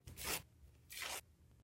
Sound effects > Objects / House appliances
Ripping paper
Sound of paper being ripped up. Recorded in a bathroom.